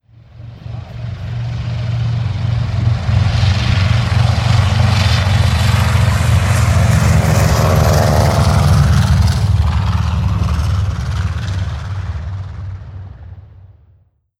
Sound effects > Vehicles
AEROMil-CU Wild Cargo Plane By Nicholas Judy TDC
A wild cargo plane passing by. Recorded at the Military Aviation Museum in Virginia Beach in Summer 2021.
fly-by, military, pass-by, Phone-recording, plane, wild-cargo